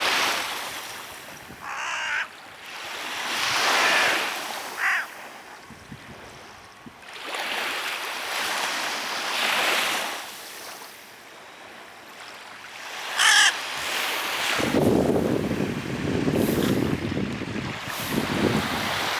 Soundscapes > Nature
📍 Swinoujscie Beach, Poland 🔊 Hooded Crow Foraging & Baltic Sea Waves (noisy)
🎙️ Details: A unique recording of a solitary hooded crow foraging on the Baltic shoreline captured in Swinoujscie, Poland. The natural beach ambience blends beautifully with subtle bird sounds as the crow searches for food among the sand and shells, before suddenly taking flight over the ocean waves, leaving only the peaceful sounds of the seaside.
baltic, bird, crow, foraging, hooded, sea, seaside, waves, wind